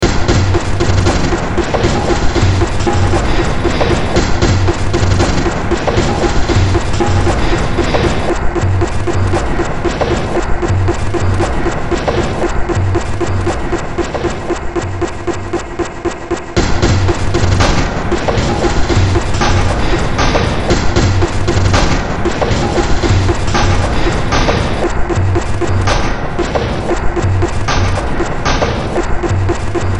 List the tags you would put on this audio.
Music > Multiple instruments
Cyberpunk
Ambient
Horror
Soundtrack
Games
Noise
Industrial
Underground
Sci-fi

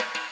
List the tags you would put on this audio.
Music > Solo percussion
beat
brass
crack
flam
fx
kit
oneshot
perc
percussion
processed
realdrums
reverb
roll
sfx
snare
snares

